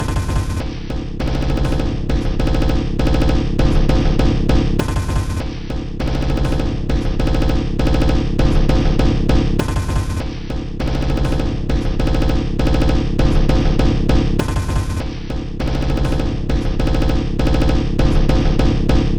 Instrument samples > Percussion
This 100bpm Drum Loop is good for composing Industrial/Electronic/Ambient songs or using as soundtrack to a sci-fi/suspense/horror indie game or short film.
Drum, Samples, Underground, Loopable, Weird, Dark, Alien, Soundtrack, Packs, Ambient, Industrial, Loop